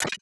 Instrument samples > Percussion
Just retouched some cymbal sample from FLstudio original sample pack. Ramdomly made with Therapy, OTT, Fruity Limiter, ZL EQ.